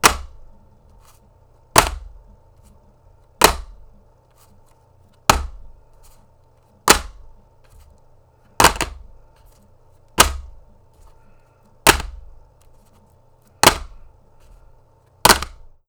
Sound effects > Objects / House appliances
FOLYProp-Blue Snowball Microphone, CU DVD Case, Fall, Drop to Floor Nicholas Judy TDC
A DVD case fall and drop to the floor.
Blue-brand, Blue-Snowball, case, drop, dvd, fall, floor, foley